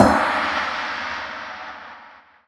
Instrument samples > Percussion
cheapgong fake 1c shorter
A crashgong to be used in metal/rock/jazz music. Shortened version of the namesake soundfile. tags: crashgong gongcrash ride crash China sinocymbal cymbal drum drums crash-gong gong gong-crash brass bronze cymbals Istanbul low-pitched Meinl metal metallic Sabian sinocymbal Sinocymbal smash Soultone Stagg synthetic unnatural Zildjian Zultan
ride, China, Zildjian, Istanbul, cymbals, crashgong, Sabian, Stagg, synthetic, Meinl, metal, cymbal